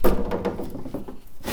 Sound effects > Other mechanisms, engines, machines
Handsaw Beam Plank Vibration Metal Foley 13
foley, fx, handsaw, hit, household, metal, metallic, perc, percussion, plank, saw, sfx, shop, smack, tool, twang, twangy, vibe, vibration